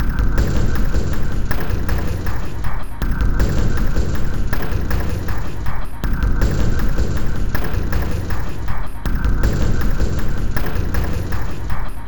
Instrument samples > Percussion
This 159bpm Drum Loop is good for composing Industrial/Electronic/Ambient songs or using as soundtrack to a sci-fi/suspense/horror indie game or short film.
Alien, Ambient, Dark, Drum, Industrial, Loop, Loopable, Packs, Samples, Soundtrack, Underground, Weird